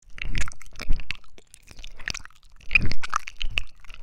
Sound effects > Other
ASMR licking
Ear licking asmr
asmr, Ear, licking